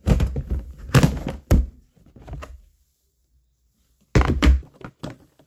Sound effects > Objects / House appliances
OBJCont-Samsung Galaxy Smartphone, CU Bin, Plastic, Unlatch, Open, Close, Latch Nicholas Judy TDC
A plastic bin unlatch, open, close and latch.
bin, close, foley, latch, open, Phone-recording, plastic, unlatch